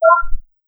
Sound effects > Vehicles
Pickup Dropoff Cancel
anouncement, bus, transportation